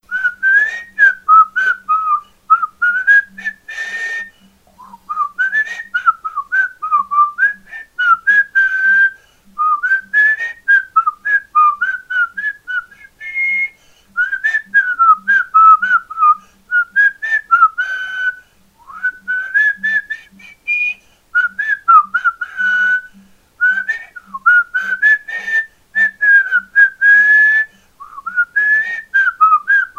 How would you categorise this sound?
Sound effects > Human sounds and actions